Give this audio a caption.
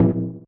Instrument samples > Percussion
Native Percussions 1 Low
Bongo, Enthnic, Native, Conga, drum